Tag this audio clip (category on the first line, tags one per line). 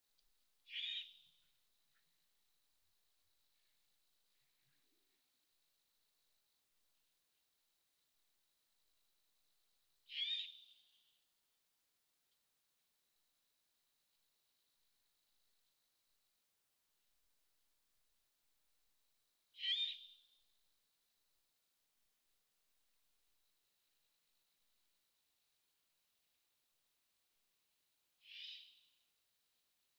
Soundscapes > Nature
bird
owl
field-recording
nature
screech
birds